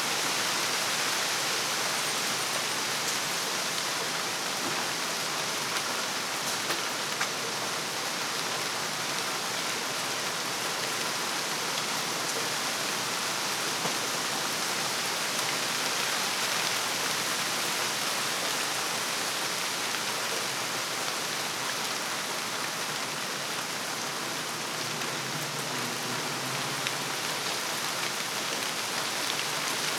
Soundscapes > Nature
Raleigh Forest Rain
Nothing but the sounds of rain hitting the leaves of the tall trees. Recorded from a crawlspace, keeping my recorder safe from the heavy rain outside, facing the forest. No thunder, just rain. Cut to loop, for any sort of application. Recorded on a Tascam DR-100mkii, processed in Pro Tools.
raleigh, soundscape, rain, ambient, nature, ambiance, raining, forest, field-recording, rainstorm